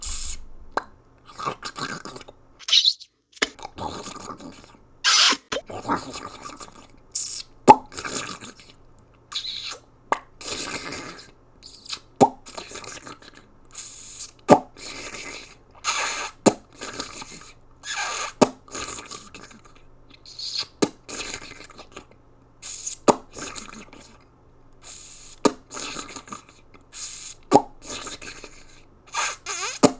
Sound effects > Human sounds and actions
TOONMisc-Blue Snowball Microphone Squeaks, Pops, Munches, Comedic Nicholas Judy TDC
Squeaks, pops and munches. Comedic effect.
comedic, squeak, munch, pop, Blue-Snowball, cartoon, Blue-brand